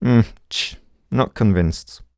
Speech > Solo speech
Doubt - hmm tst not convinced
singletake, unsure, skepticism, Tascam, unconvinced, talk, Video-game, voice, NPC, skeptic, oneshot, Voice-acting, Mid-20s, dialogue, Male, Man, FR-AV2, doubt, Human, Vocal, Neumann, U67, Single-take